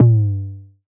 Instrument samples > Synths / Electronic
A tom one-shot made in Surge XT, using FM synthesis.